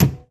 Sound effects > Objects / House appliances
DOORAntq-Samsung Galaxy Smartphone, CU Wooden Treasure Chest Close Nicholas Judy TDC
A wooden treasure chest close. Recorded at Goodwill.